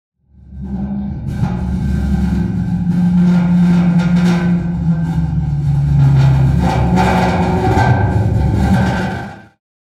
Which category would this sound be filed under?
Sound effects > Other